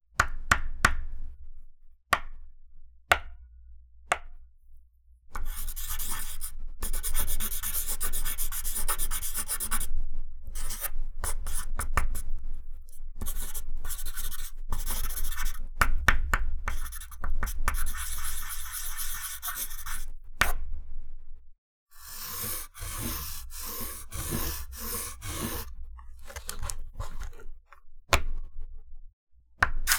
Sound effects > Objects / House appliances
Pencil - Writing and Taps
Intense scribbling, tapping, and writing with a pencil on a piece of paper.
note, tapping, studying, crayon, writing, paper, chalkboard, shading, chalk, drawn, written, pencil, tap, drawing